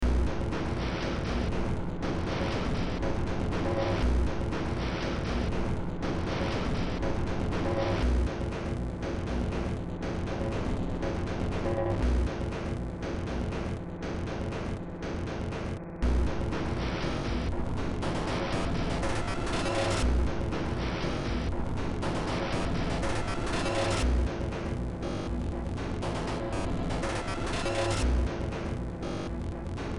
Music > Multiple instruments
Demo Track #3031 (Industraumatic)
Industrial, Sci-fi, Horror, Soundtrack, Cyberpunk, Noise, Underground, Games, Ambient